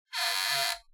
Sound effects > Other mechanisms, engines, machines
Squeaky Hinge
Creaky, Hinge, Squeak